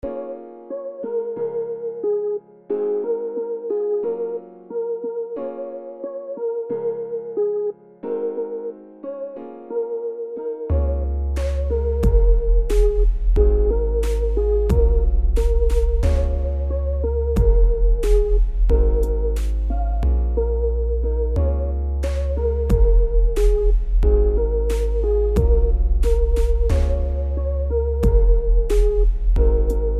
Music > Multiple instruments
Sunshine in the Dawn Mist loop
atmosphere, background, loop, piano, relax